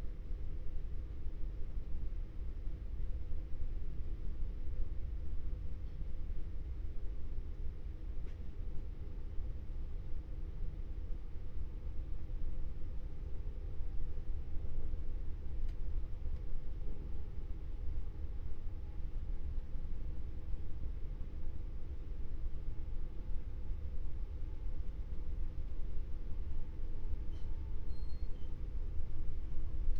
Soundscapes > Other
250710 11h45ish Baziège-Bram OMK1
Subject : On a travel form TLS to Carcassonne, here cut from Baziège to Bram. Date YMD : 2025 July 10. 11h45 ish. Location : In train Occitanie France. Soundman OKM 1 Weather : Processing : Trimmed in Audacity.
July, vehicle-ambience, Train, OKM1, FR-AV2, travel, Soundman, Juillet, Tascam, Binaural, France, field-recording, summer, 2025, SNCF